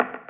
Instrument samples > Synths / Electronic
CVLT BASS 145
bass, bassdrop, clear, drops, lfo, low, lowend, stabs, sub, subbass, subs, subwoofer, synth, synthbass, wavetable, wobble